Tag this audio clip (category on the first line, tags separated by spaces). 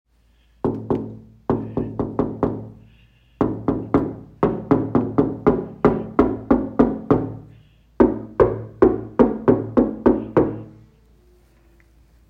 Sound effects > Human sounds and actions
Window Banging Hand Knuckles